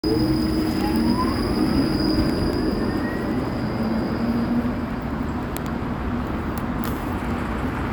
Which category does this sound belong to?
Sound effects > Vehicles